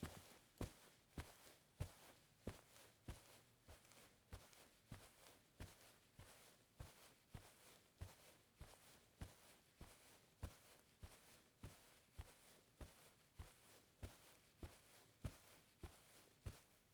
Sound effects > Human sounds and actions
footsteps, carpet, walk
carpet, walking, foley, footstpes